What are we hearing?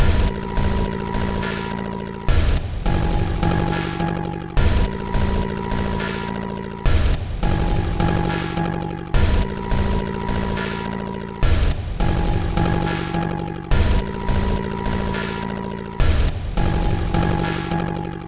Instrument samples > Percussion
This 105bpm Drum Loop is good for composing Industrial/Electronic/Ambient songs or using as soundtrack to a sci-fi/suspense/horror indie game or short film.
Weird, Loop, Alien, Soundtrack, Underground, Packs, Loopable, Dark, Samples, Drum, Ambient, Industrial